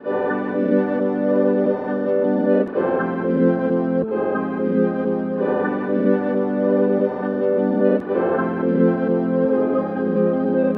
Music > Solo instrument
Dreamy Piano Chord Progression - 89bpm
🔥This sample is free🔥👽 Dreamy synth chord progression designed with vocals, pianos samples that I made in Ableton 11.
89bpm, ambient, dreamy, instrument, piano, progression, synth, vaporwave